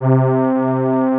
Instrument samples > Other
Synth brass (C)
Synth brass i made in furnace tracker.